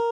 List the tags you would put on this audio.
Instrument samples > String
guitar sound stratocaster arpeggio tone cheap design

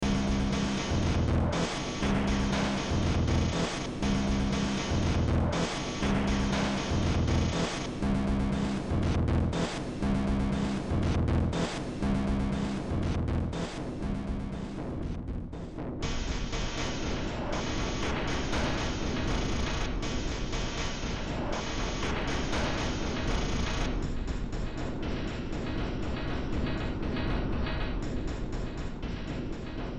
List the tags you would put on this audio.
Music > Multiple instruments
Cyberpunk Ambient Sci-fi Underground Industrial Horror Games Soundtrack Noise

